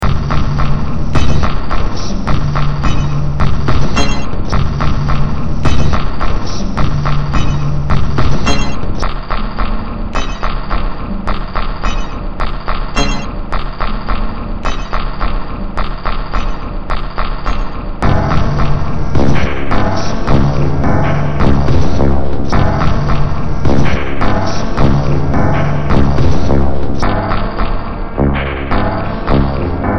Music > Multiple instruments
Demo Track #3034 (Industraumatic)
Noise, Horror, Soundtrack, Games, Ambient, Sci-fi, Cyberpunk, Underground, Industrial